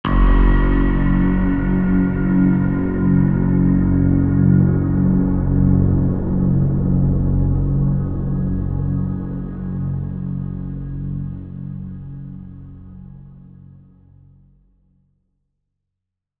Music > Multiple instruments
Horror Sting (Deep Foreboding) 2
I love seeing how people use my work! ----- I'm grateful to the following people whose sounds I used into the creation of this: - "LOOP metal door in wind 959 150329_01" by klankbeeld - "F bass note guitar string" by Vrezerino